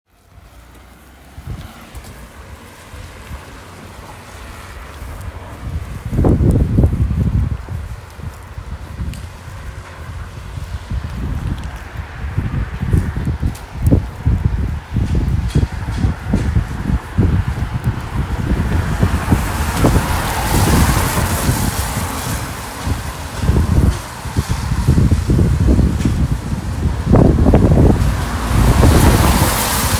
Sound effects > Vehicles
Montées Mont Rose
Density, Marseille, urban